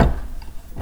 Sound effects > Other mechanisms, engines, machines
metal shop foley -002
bang, boom, bop, crackle, fx, knock, little, metal, perc, percussion, pop, rustle, shop, strike, tink, tools